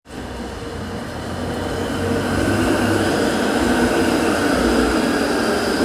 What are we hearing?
Sound effects > Vehicles
tram rain 14
tram, rain, motor